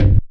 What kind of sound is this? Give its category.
Instrument samples > Synths / Electronic